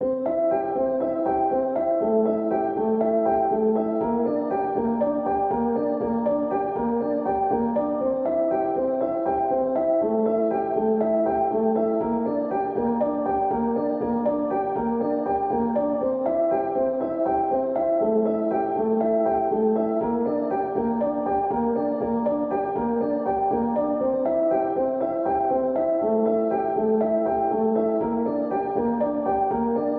Music > Solo instrument
Piano loops 155 efect 4 octave long loop 120 bpm
free, samples, simple, simplesamples